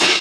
Instrument samples > Percussion
crash Sabian HHX low-pitched puny
a very bass hi-hat A low-pitched Sabian HHX Evolution Ozone CR(initially 20 inches but now it's weird), EQed and mixed with other crashes at a lower volume. It sounds like a very bass hi-hat.
bang, boom, China, Chinese, clang, clash, crack, crash, crunch, cymbal, flangcrash, hi-hat, Istanbul, low-pitched, Meinl, metal, metallic, Paiste, ride, Sabian, shimmer, sinocrash, Sinocymbal, sizzle, smash, Soultone, Stagg, UFIP, Zildjian